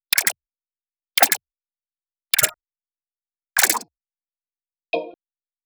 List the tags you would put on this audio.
Sound effects > Electronic / Design
click confirm electronic ok okay robotic scifi sfx synthetic tech technology techy ui userinterface yes

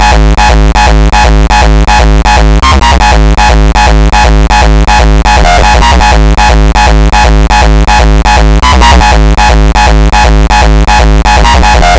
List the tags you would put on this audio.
Music > Solo percussion
Distorted Hardstyle Kick Loop Rawstyle ZaagKick

